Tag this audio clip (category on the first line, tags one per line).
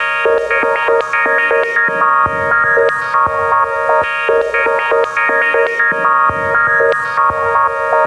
Music > Solo instrument
80s
Analog
Analogue
Loop
Music
MusicLoop
Rare
Retro
Synth
SynthLoop
SynthPad
Texture
Vintage